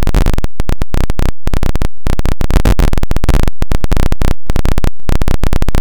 Sound effects > Experimental
a ton of clicks
made in openmpt. just very very low pitch noise. with a slight pitch up in 2 places cuz i felt like it
clicks, clicky, digital, electronic, harsh, loud, low-pitch, noise